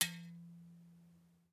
Sound effects > Other mechanisms, engines, machines
High Boing 02
sample, noise, boing